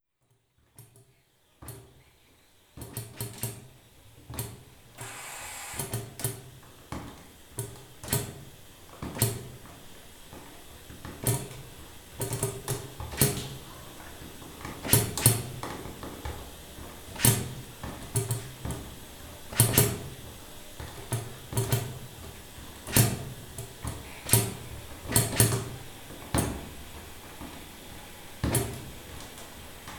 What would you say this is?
Sound effects > Other mechanisms, engines, machines
The random rattly clunking of an escape valve opening and closing, in an echoey chamber beneath a Victorian steam-driven beam engine. Occasional hissing jets of steam are also audible. Recorded with a hand-held Zoom H5, using its standard X/Y microphone capsule.